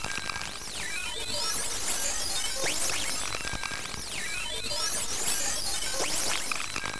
Sound effects > Electronic / Design
Space Calculation
commons, creative, free, industrial-noise, noise, rhythm, royalty, sci-fi, scifi, sound-design